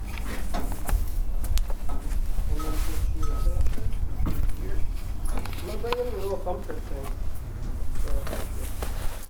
Sound effects > Objects / House appliances
Junkyard Foley and FX Percs (Metal, Clanks, Scrapes, Bangs, Scrap, and Machines) 159
Clank, garbage, Machine, Percussion, Bash, SFX, Robotic, scrape, rattle, Dump, Smash, Ambience, tube, Metallic, Perc, Metal, Bang, rubbish, Clang, waste, Atmosphere, Foley, Robot, dumpster, Environment, trash, Junk, FX, dumping, Junkyard